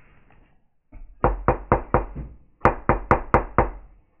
Sound effects > Objects / House appliances
kbock-knock-knock
knocking on a wood door
knock, knocking